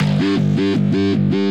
Music > Other
guitar whammy bass
whammy, techno, bass